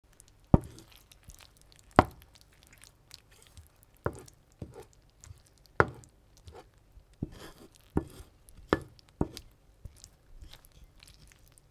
Sound effects > Objects / House appliances
Kitchen cooking sound recorded in stereo. Food simmering while stirred with wooden spoon